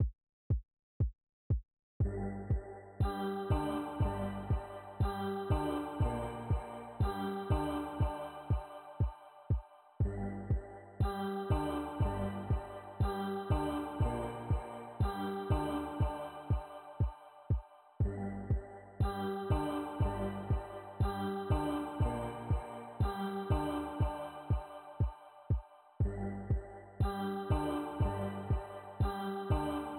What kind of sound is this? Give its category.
Music > Other